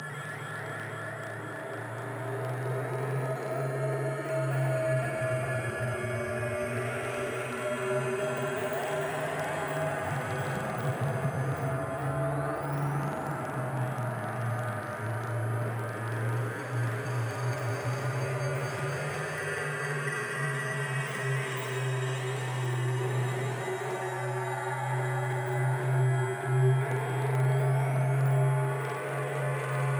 Soundscapes > Synthetic / Artificial
ambient drone landscape texture alien evolving experimental atmosphere dark shimmer shimmering glitchy glitch long low rumble sfx fx bass bassy synthetic effect ambience slow shifting wind howl roar
landscape, roar, slow, shimmering, low, rumble, fx, synthetic, texture, evolving, alien, sfx, shifting, glitchy, glitch, drone, experimental, bassy, dark, shimmer, ambient, atmosphere, howl, effect, ambience, bass, long, wind